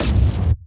Sound effects > Experimental
destroyed glitchy impact fx -006
sfx, experimental, glitchy, idm, edm, zap, glitch, lazer, whizz, otherworldy, crack, laser, alien, snap, percussion, fx, abstract, impact, impacts, clap, perc, hiphop, pop